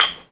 Sound effects > Objects / House appliances
Small Wood Piece Sound
Clanking of two pieces of wood. In actuality it is two pieces of plastic, two covers for a washing machine floor panel. Recorded with a Canon EOS M50 in a kitchen. Stereo-split, normalized, faded out and extracted using Audacity.